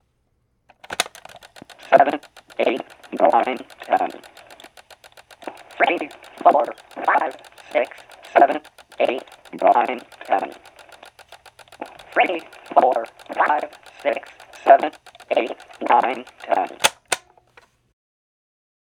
Speech > Processed / Synthetic
Faulty Cassette Tape, Person Counting
Was testing out a tape loop that didn't work out quite right- learned a couple things, and thought I'd just throw the test results up here! Not sure what they'd be useful for, but if you come up with something cool, would love to know about it! Maybe something with horror or suspense, that old school glitch sound might work well for that!
poor, cassette-tape, tape, horror, cassette, broken, scary, recorder